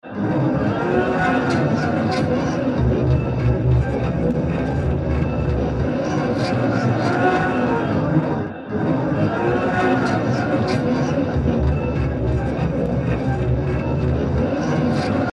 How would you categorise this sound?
Sound effects > Experimental